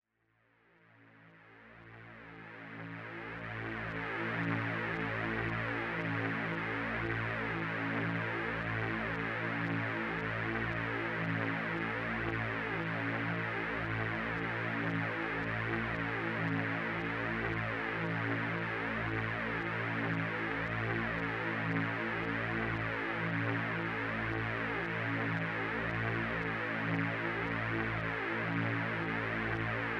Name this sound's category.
Music > Solo instrument